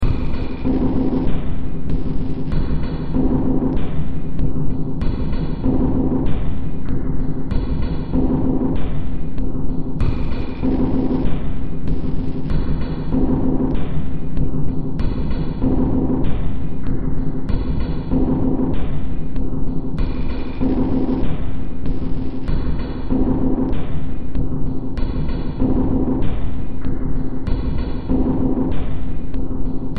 Music > Multiple instruments
Demo Track #3990 (Industraumatic)
Ambient, Underground, Cyberpunk, Games, Sci-fi, Industrial, Soundtrack, Horror, Noise